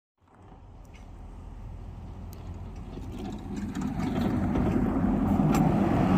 Sound effects > Vehicles
final bus 31
bus, hervanta